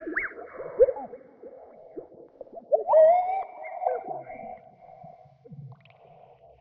Soundscapes > Synthetic / Artificial
LFO Birsdsong 79
Description on master track
Birsdsong, massive